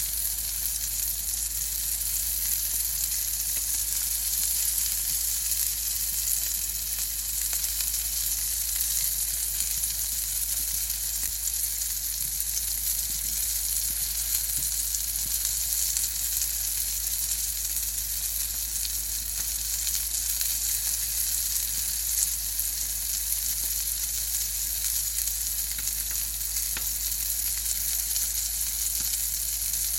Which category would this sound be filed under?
Sound effects > Objects / House appliances